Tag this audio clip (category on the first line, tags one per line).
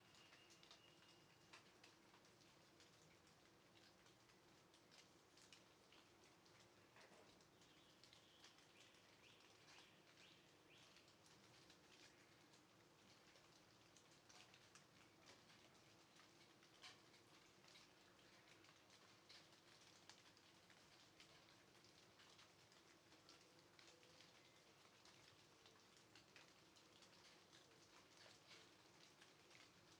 Soundscapes > Nature
weather
birds
rain